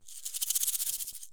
Instrument samples > Percussion
Dual shaker-015
percusive, recording, sampling